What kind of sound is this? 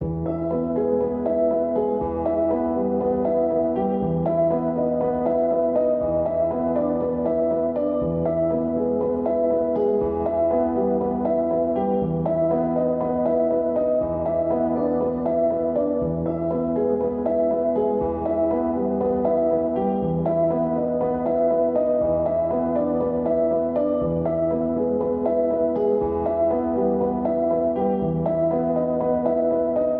Music > Solo instrument
Piano loops 028 efect 4 octave long loop 120 bpm
reverb, samples, music, piano, 120, 120bpm, simplesamples, pianomusic, loop, simple, free